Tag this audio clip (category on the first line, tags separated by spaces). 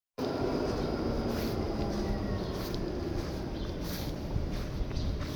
Soundscapes > Urban
Tampere recording tram